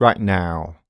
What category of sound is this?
Speech > Solo speech